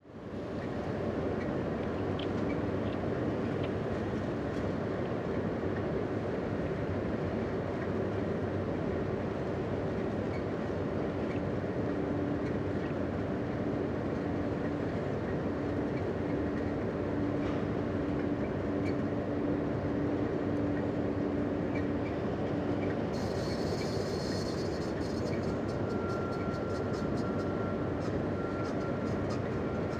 Soundscapes > Urban
Unloading dock, hudge unloading crane, conveyor belt. General ambiance.